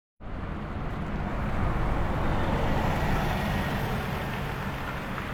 Sound effects > Vehicles
A bus passes by
bus, bus-stop, Passing